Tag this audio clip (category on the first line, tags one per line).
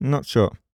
Speech > Solo speech
Shotgun-mic
not-sure
VA
Generic-lines
hesitant
Hypercardioid
Voice-acting
unsure
july
2025
Single-mic-mono
mid-20s
Calm
Shotgun-microphone
Sennheiser
FR-AV2
Tascam
MKE-600
Male
Adult
MKE600